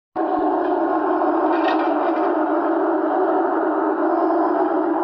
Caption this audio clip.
Sound effects > Vehicles
tram driving by4
Sound of a a tram drive by in Hervanta in December. Captured with the built-in microphone of the OnePlus Nord 4.